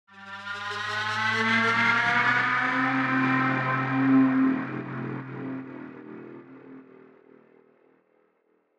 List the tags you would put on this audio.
Sound effects > Electronic / Design
abstract effect efx electric fx psy psyhedelic psytrance sci-fi sfx sound sound-design sounddesign soundeffect

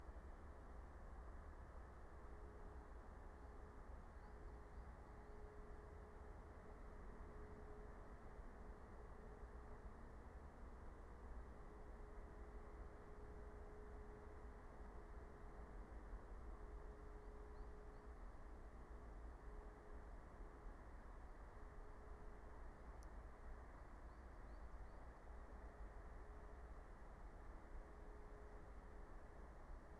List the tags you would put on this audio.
Soundscapes > Nature
meadow soundscape alice-holt-forest natural-soundscape phenological-recording raspberry-pi field-recording nature

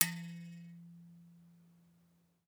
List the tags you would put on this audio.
Sound effects > Other mechanisms, engines, machines
boing garage sample

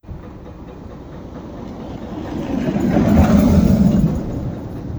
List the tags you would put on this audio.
Sound effects > Vehicles
automobile vehicle

